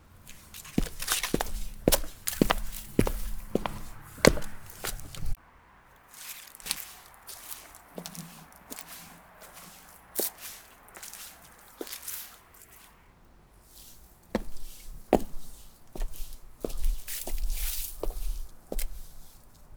Sound effects > Human sounds and actions
walking slow stereo heels
Walking slowly on heels in the forest in stereo
slow, forest, stereo, heels, walk, walking